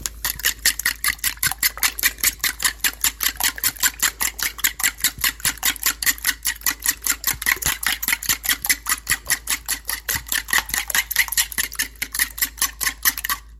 Sound effects > Objects / House appliances
FOODCook-Samsung Galaxy Smartphone, CU Mixing, Egg Yolks, Liquid, In Bowl Nicholas Judy TDC
Mixing liquid egg yolks in a bowl.
eggs, mix, foley, bowl, Phone-recording, yolk